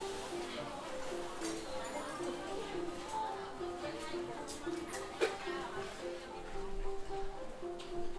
Soundscapes > Urban
School Ambience, Kampot, Cambodia (May 10, 2019)
Recording at a school in Kampot, Cambodia, on May 10, 2019. Includes children’s voices, classroom sounds, background music, and the vibrant energy of a school environment.
children, field, school, recording, ambience, Cambodia, voices, classroom, Kampot